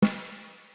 Music > Solo percussion
Snare Processed - Oneshot 198 - 14 by 6.5 inch Brass Ludwig
realdrums, beat, drumkit, perc, drums, snaredrum, reverb, hit, snare, fx, crack, drum, rim, flam, snares, ludwig, roll, snareroll, rimshot, brass, sfx, processed, realdrum, percussion, oneshot, kit, hits, rimshots, acoustic